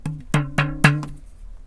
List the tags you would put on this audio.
Sound effects > Objects / House appliances
hit
bonk
fieldrecording
industrial
object
foley
drill
perc
fx
mechanical
metal
sfx
natural
percussion
oneshot
foundobject
glass
stab
clunk

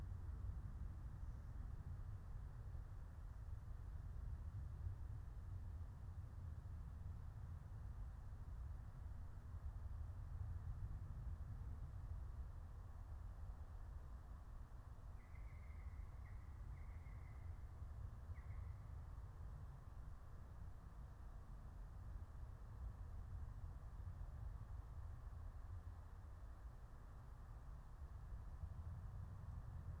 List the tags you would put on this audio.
Soundscapes > Nature
alice-holt-forest
field-recording
nature
raspberry-pi

